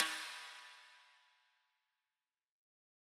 Music > Solo percussion
Snare Processed - Oneshot 42 - 14 by 6.5 inch Brass Ludwig
drum, sfx, reverb, drumkit, rimshot, realdrum, rimshots, oneshot, roll, percussion, snares, ludwig, perc, hit, beat, snareroll, drums, fx, snare, crack, hits, realdrums, kit, snaredrum, processed, brass, acoustic, rim, flam